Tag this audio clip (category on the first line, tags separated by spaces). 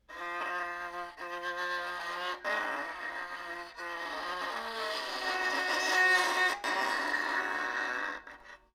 Instrument samples > String
unsettling beatup violin bow uncomfortable strings horror creepy broken